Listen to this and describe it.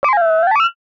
Sound effects > Other mechanisms, engines, machines
Small Robot - Surprise
A surprise sounding small robot, bleeping. I originally designed this for some project that has now been canceled. Designed using Vital synth and Reaper
artificial, bleep, science-fiction, game, robot, digital, sci-fi, computer